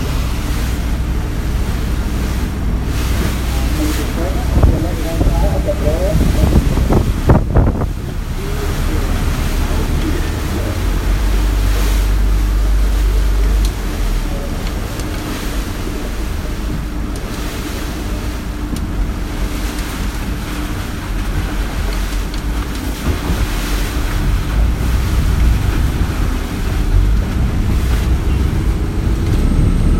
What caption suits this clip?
Soundscapes > Other
Boat Ride, Chao Phraya River, Bangkok, Thailand (Feb 22, 2019)
Soundscape from inside a taxi boat on the Chao Phraya River. Includes water movement, boat motor hum, and distant city noise.